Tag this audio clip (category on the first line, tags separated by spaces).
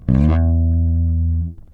Instrument samples > String
blues electric mellow charvel pluck loop rock oneshots loops plucked bass riffs funk fx slide